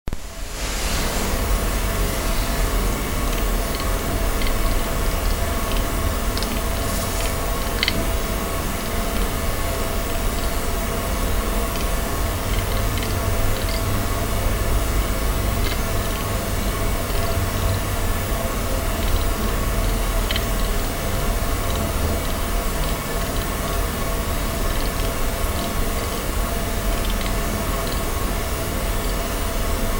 Soundscapes > Other
Bandwidth module with wavelength frequencies (Red, Blue, Yellow). Filter with White noise, affords Yellow in bandwidth spectrum && 528 Erlang Red.
Noise, GaSaTaRaXa, Motorway, Ambient, Atmosphere, Cinematic, OyXaEl, WaVaPaAl, Highway, Ride, A-Xathoth, Transport, Transportation, Drive, Travel, Road